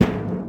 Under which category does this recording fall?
Instrument samples > Percussion